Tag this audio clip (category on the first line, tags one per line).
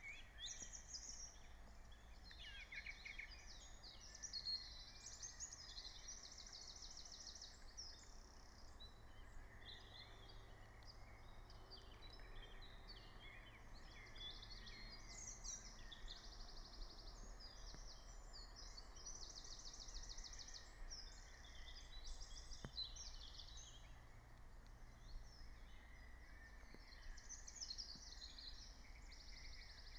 Soundscapes > Nature
alice-holt-forest
field-recording
meadow
natural-soundscape
nature
phenological-recording
raspberry-pi
soundscape